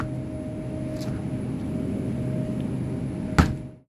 Sound effects > Other mechanisms, engines, machines
DOORAppl-Samsung Galaxy Smartphone, CU Hussman Large Industrial Freezer Door, Open, Close Nicholas Judy TDC
A Hussman large industrial freezer door opening and closing. Recorded at Target.
close, foley, freezer